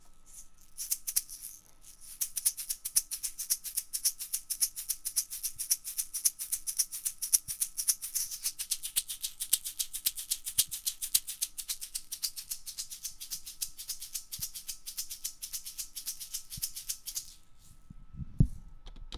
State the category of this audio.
Instrument samples > Percussion